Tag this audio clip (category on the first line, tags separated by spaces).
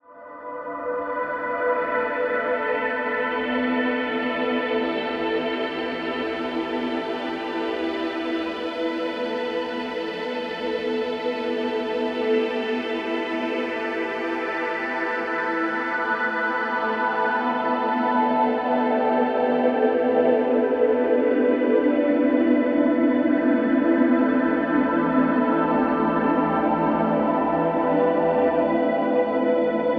Soundscapes > Synthetic / Artificial
ambient atmo atmosphere background desing drone effect pad sound-design